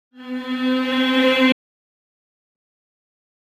Instrument samples > Synths / Electronic
Deep Pads and Ambient Tones9
Tone, Tones, Note, Pads, Dark, Ominous, bassy, Pad, bass, Chill, Deep, Ambient, Oneshot, synthetic, Analog, Digital, Synth, Haunting, Synthesizer